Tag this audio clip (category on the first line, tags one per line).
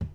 Sound effects > Objects / House appliances
bucket
carry
clang
clatter
cleaning
container
debris
drop
fill
garden
handle
hollow
knock
lid
metal
object
pail
plastic
pour
scoop
shake
slam
spill
tip
tool